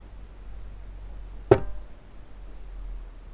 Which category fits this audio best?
Instrument samples > Percussion